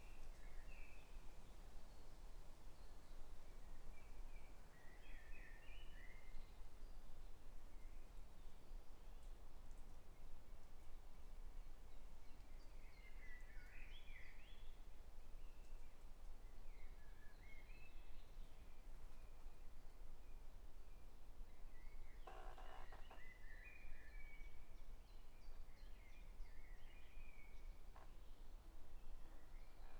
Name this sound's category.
Soundscapes > Nature